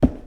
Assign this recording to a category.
Sound effects > Objects / House appliances